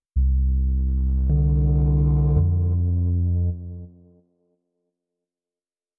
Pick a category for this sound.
Soundscapes > Synthetic / Artificial